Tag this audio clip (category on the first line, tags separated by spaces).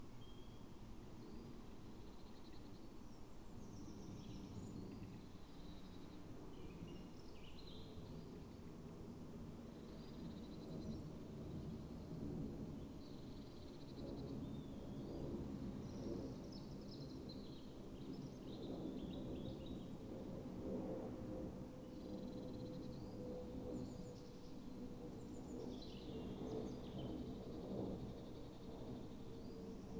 Soundscapes > Nature
soundscape phenological-recording weather-data alice-holt-forest raspberry-pi Dendrophone data-to-sound natural-soundscape